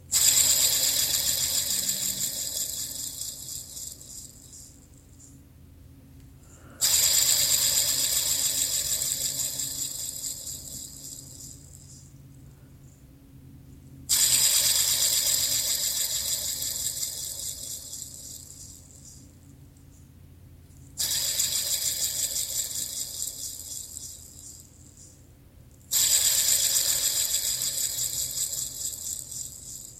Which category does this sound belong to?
Sound effects > Objects / House appliances